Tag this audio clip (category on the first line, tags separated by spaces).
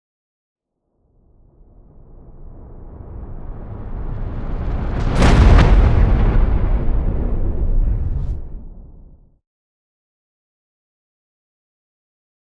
Sound effects > Other
riser deep implosion transition industrial movement bass thud cinematic game epic trailer whoosh sweep sub impact boom stinger explosion hit tension